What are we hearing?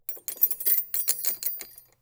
Sound effects > Other mechanisms, engines, machines
Woodshop Foley-064
percussion, wood, strike, little, boom, bop, shop, fx, pop, foley, knock, bang, metal, sfx, thud, tools, tink, sound